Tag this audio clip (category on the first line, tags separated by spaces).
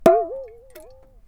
Sound effects > Objects / House appliances
natural mechanical glass percussion foley industrial hit stab fieldrecording perc object bonk fx oneshot metal foundobject drill clunk sfx